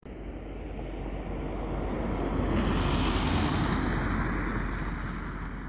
Sound effects > Vehicles
A car passing by in Hervanta, Tampere. Recorded with Samsung phone.
engine, car, vehicle